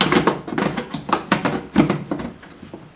Sound effects > Objects / House appliances
Forcing Piece of Plastic Into Metal Device
Rattling and grinding of a plastic cover, trying to fit it, adjusting it into a metal panel floor of a washer. Recorded with a Canon EOS M50 in a kitchen. Stereo-split, normalized and extracted using Audacity.
anger, angry, fail, failing, forced, grind, grinding, it-does-not-fit, it-will-not-go-in, rattle, rattling, rub, rubbing, shake, shaking, slide, sliding, this-will-never-work, try, trying, waggle, wiggle